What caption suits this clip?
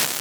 Instrument samples > Synths / Electronic
databent open hihat 1
glitch, percussion